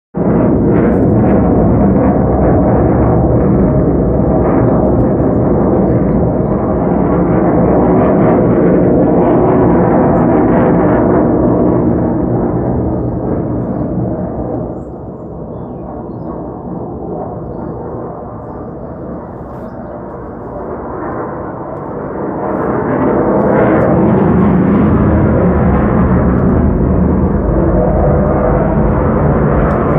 Soundscapes > Urban
American war jets pass over Sicily. A dog barks scared in the background.